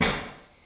Sound effects > Other
Slowed down sample of my chair hitting on the table. Recorded with my phone.
collide, hit, impact, thud